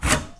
Sound effects > Other mechanisms, engines, machines
Handsaw Tooth Teeth Metal Foley 8
foley, handsaw, metal, perc, plank, saw, shop, smack, tool, twangy, vibe